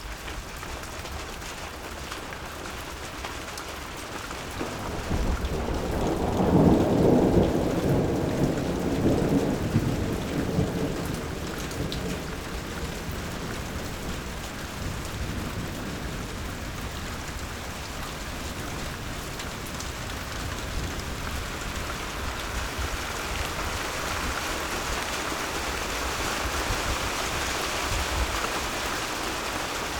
Sound effects > Natural elements and explosions
RAIN Overflowing rain gutter and thunder
Overflowing rain gutter and thunder recorded with a Zoom H6
metal, rain, raining, roof, sfx, shower, storm, thunder, thunderstorm, weather